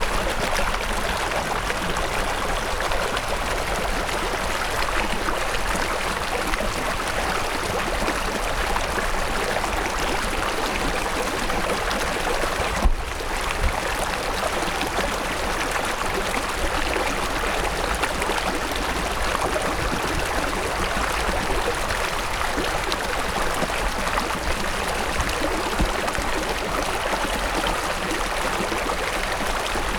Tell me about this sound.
Soundscapes > Nature
Daytime recording of flowing mountain river water. Recorded with a Zoom H1essential.